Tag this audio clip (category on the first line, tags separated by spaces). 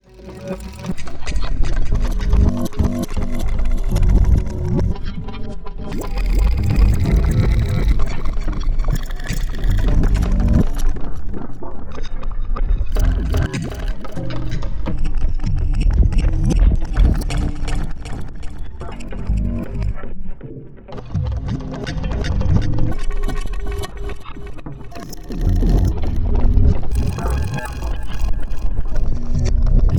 Soundscapes > Synthetic / Artificial
dark industrial noise synth techno texture